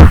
Instrument samples > Percussion
6 bit snare
odd recording that is 6 bit.
snare, sample, percussion